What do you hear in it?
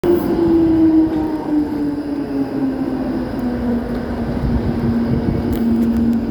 Sound effects > Vehicles
26tram passingintown
A tram is passing by in the city center. The speed slows down initially, but then remains the same for the rest of the audio track. Recorded in Tampere with a samsung phone.
public-transportation, traffic